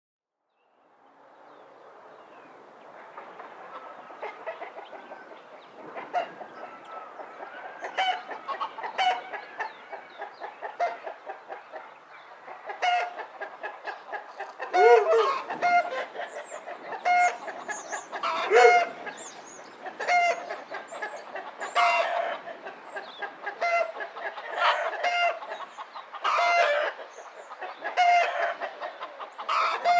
Soundscapes > Nature
A handful of roosters have a heated debate about the stranger wielding a fuzzy stick who has just entered their domain. I had to cut the low end and only one channel of the original stereo recording was usable due to excessive wind.